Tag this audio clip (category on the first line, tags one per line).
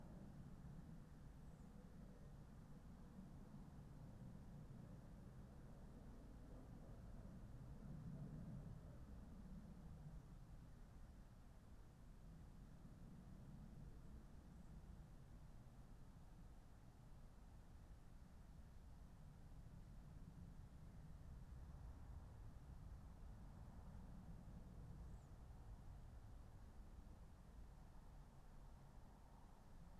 Soundscapes > Nature
alice-holt-forest
artistic-intervention
data-to-sound
modified-soundscape
natural-soundscape
soundscape
weather-data